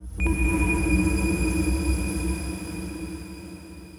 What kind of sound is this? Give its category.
Sound effects > Electronic / Design